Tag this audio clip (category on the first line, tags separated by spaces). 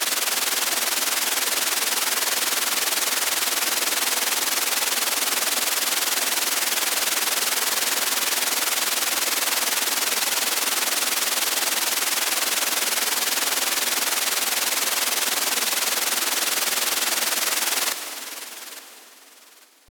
Soundscapes > Nature
Ambient Forest